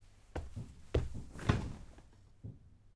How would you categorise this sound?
Sound effects > Objects / House appliances